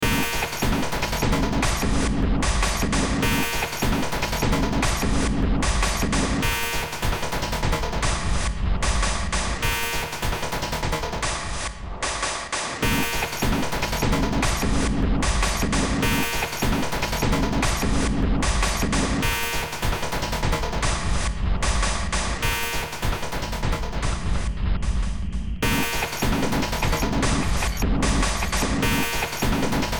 Music > Multiple instruments
Short Track #3630 (Industraumatic)
Ambient, Cyberpunk, Games, Horror, Industrial, Noise, Sci-fi, Soundtrack, Underground